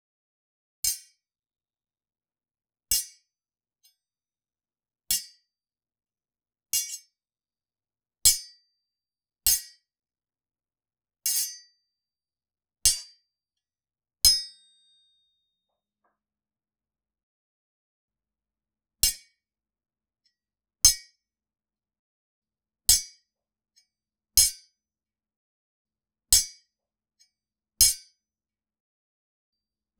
Sound effects > Objects / House appliances
silverware sword or knives hits scrapes sounds 02202025
custom sai and swords hitting with scrape sounds.
clash, karate, ring, blade, jeffshiffman, knife, impact, melee, kung-fu, silverware, scrape, combat, fighting, metal, tmnt, medieval, weapon, psai, battle, attack, clank